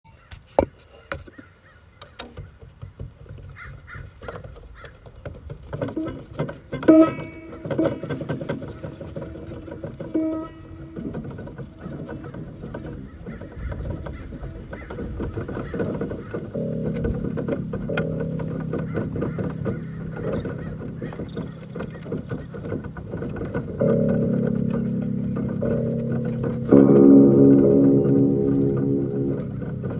Soundscapes > Nature
Piano inside a abalone shell 06/28/2023
experiment, field-recordings, outdoors, piano, prepered